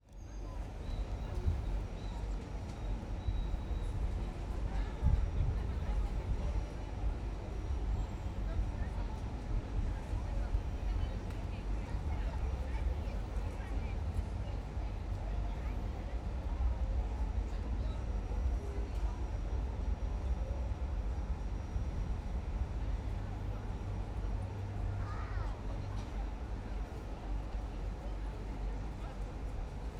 Soundscapes > Urban
Jarmark Jakubowy 2025.07.26 Annual festival held by Archcathedral in Szczecin. Field recording in the Old Town district, including crowd, traffic, marketplace, discussion, background music, children, conversation and city ambience. Recorded with Zoom F3 and Sonorous Objects SO.1 microphones in stereo format.